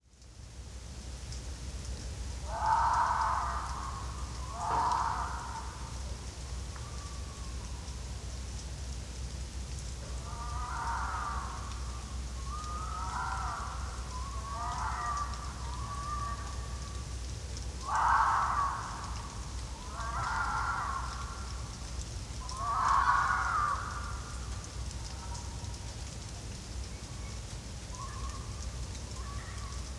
Soundscapes > Nature
scary fox at night
britany, countryside, field-recording, fieldrecording, forest, fox, frightening, horror, nature, night, rain, scaring, scary, screaming, soundscape